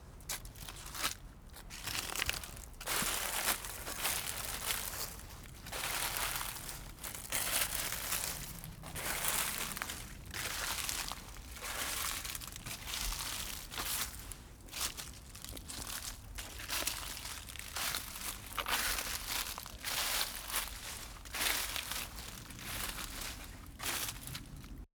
Soundscapes > Nature
Walking On Leaves - London
Walking on slightly wet autumn leaves - London.
footsteps, walking, outdoors, nature, ground, wet-leaves, floor